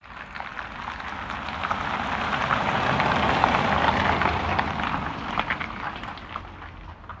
Sound effects > Vehicles
an electric vehicle driving by